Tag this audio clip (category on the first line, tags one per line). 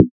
Instrument samples > Synths / Electronic
additive-synthesis
fm-synthesis
bass